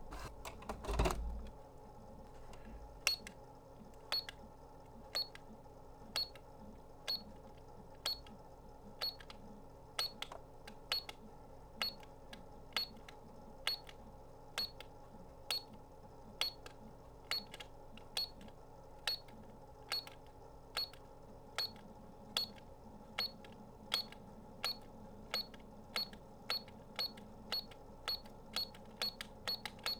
Sound effects > Objects / House appliances
TOYElec-Blue Snowball Microphone, MCU Barcode Scanner, Beeps Nicholas Judy TDC
Toy barcode scanner with electronic beeps.
toy, electronic, Blue-brand, Blue-Snowball, beep, barcode-scanner